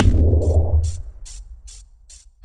Sound effects > Electronic / Design
Impact Percs with Bass and fx-022
fx, oneshot, foreboding, smash, crunch, deep, impact, combination, sfx, explode, theatrical, bass, mulit, explosion, looming, percussion, perc, brooding, ominous, hit, bash, cinamatic, low